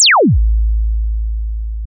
Sound effects > Other
Strong laser shoot, this sfx can be used for critical shots (in game of course)